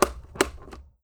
Sound effects > Objects / House appliances
A plastic tray closing.